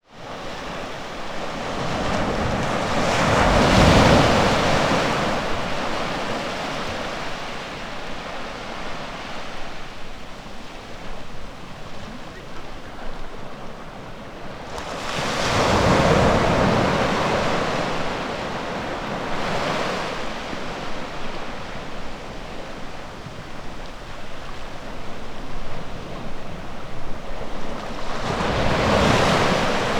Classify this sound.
Soundscapes > Nature